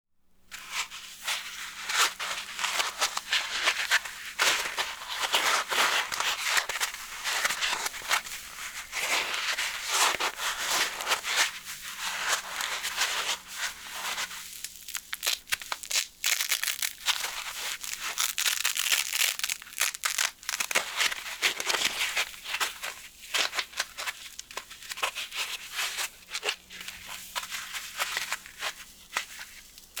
Sound effects > Objects / House appliances
OBJPack Foam
Handling, crushing and tearing expanded polypropylene packaging foam in my hand in a small office environment.